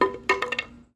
Sound effects > Objects / House appliances
WOODImpt-Samsung Galaxy Smartphone, CU Board Drop 05 Nicholas Judy TDC
A wooden board drop.
drop, foley, Phone-recording, wooden, board